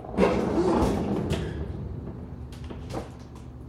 Sound effects > Other mechanisms, engines, machines
Sliding Door (2)
Recorded the sound of my home's sliding freezer door using my computer.
elevator, hatch, sliding, door